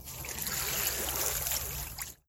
Sound effects > Natural elements and explosions
WATRMvmt-Samsung Galaxy Smartphone, CU Whoosh By Nicholas Judy TDC
Water whooshing by.